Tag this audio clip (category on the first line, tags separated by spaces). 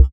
Instrument samples > Synths / Electronic
fm-synthesis bass